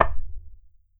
Sound effects > Human sounds and actions
LoFiFootstep Carpet 01
Shoes on carpet. Lo-fi. Foley emulation using wavetable synthesis.
running, synth, footstep, carpet, walking, lofi, footsteps, steps, walk